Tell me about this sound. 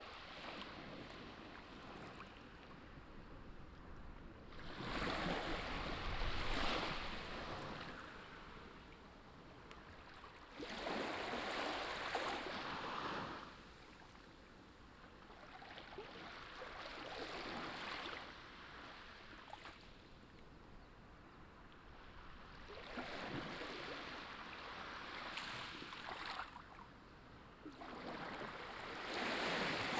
Soundscapes > Nature
Calm Sea Waves1 - Close - Japan - Binaural
Recorded waves on a beach in a small quiet town in Japan. Recorded with: Zoom H5 Soundman OKM2 Classic